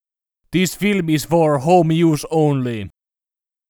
Speech > Solo speech
This film is for home use only
male, voice, warning, human, man, announcer, calm